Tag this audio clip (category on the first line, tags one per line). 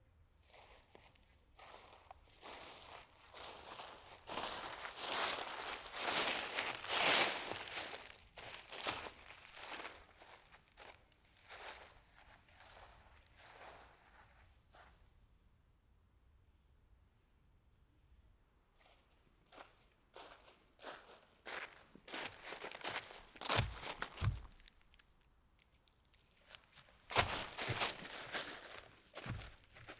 Sound effects > Human sounds and actions
forest
slow
walk
stereo